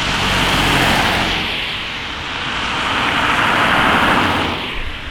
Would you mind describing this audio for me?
Sound effects > Vehicles
Car00064146CarMultiplePassing
Sound recording of cars driving on a road, with quick succession of multiple cars being heard one after the other. The recording was made on a rainy, winter day. The segment of the road the recording was made at was in an urban environment without crosswalks or streetlights. Recorded at Tampere, Hervanta. The recording was done using the Rode VideoMic.
drive, field-recording, car, automobile, vehicle, rainy